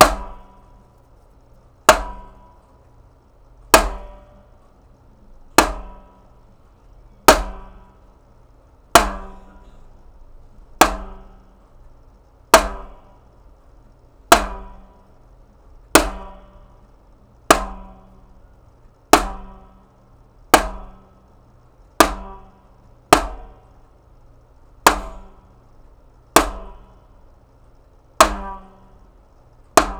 Music > Solo percussion
MUSCPerc-Blue Snowball Microphone, CU Desktop Drums, Big Drum, Hits, Bangs, Soft, Hard Nicholas Judy TDC
Big drum hits and bangs. Soft and hard. Desktop drums.